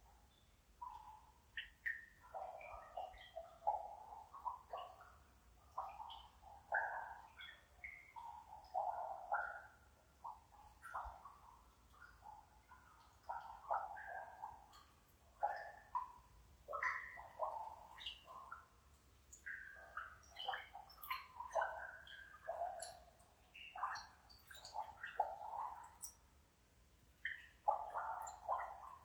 Soundscapes > Nature
cave dripping water
Field-recording from the entrance of a small cave with water on the floor and water dripping from the cave ceiling. Edited to reduce the wind sound a bit.
cave, dripping, field-recording, water